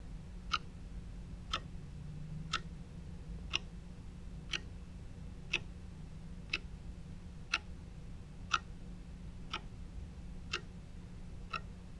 Sound effects > Objects / House appliances
Clock Ticking
I placed a large wall clock on the carpet with my recorder right up against the mechanical part of the clock and recorded it. Loops perfectly for any scene needing just an ambient clock sound ticking! Used in my visual novel: R(e)Born_ Recorded with Sony ICD-UX570, referenced with AKG K240.
clock, tic, time, wall-clock